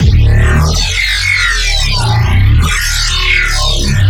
Instrument samples > Synths / Electronic
Another bass I made with Vital.